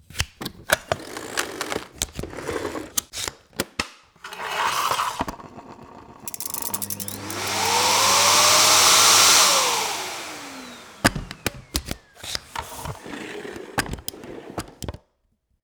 Sound effects > Other
Vacuum cleaner ASMR
Edited recordings of my Philips PowerPro 7000 series Bagless Vacuum Cleaner into one ckicly ckalk vrumm sound ear candy words thing. Recorded with a Tascam FR-AV2 and a Sennheiser MKE600 shotgun microphone. 2025 07 26 edited the 28th. France. Using audacity.
Sennheiser, designed, MKE600, Experimental, vacuum, ear-candy, FR-AV2, ASMR, tascam, vacuum-cleaner, cleaner